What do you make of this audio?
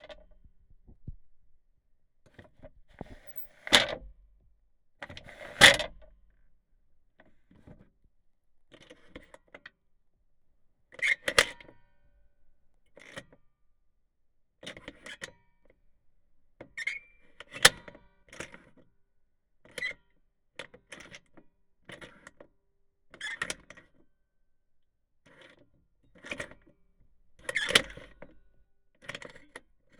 Sound effects > Other
DOORHdwr-Contact Mic Old soviet entery door with lockers SoAM Sound of Solid and Gaseous Pt 1 Apartment
lock, door, unlocking, unlock, closing, keys, locking